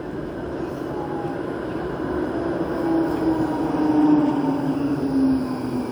Soundscapes > Urban
voice 14-11-2025 2 tram
Rattikka, Tram, TramInTampere